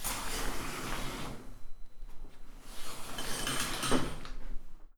Sound effects > Objects / House appliances
FOLYProp-Tascam DR05 Recorder, CU Curtain, Shower, Old Fashioned, Open, Close Nicholas Judy TDC
An old-fashioned shower curtain opening and closing.
close, curtain, foley, old-fashioned, open, shower, Tascam-brand, Tascam-DR-05, Tascam-DR05